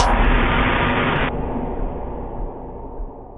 Sound effects > Electronic / Design
Impact Percs with Bass and fx-013
looming, bass, oneshot, bash, sfx, perc, smash, low, cinamatic, fx, crunch, hit, explode, deep, impact, ominous, percussion, explosion, mulit, combination, brooding, foreboding, theatrical